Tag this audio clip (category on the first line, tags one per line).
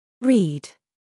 Speech > Solo speech
english
pronunciation
voice
word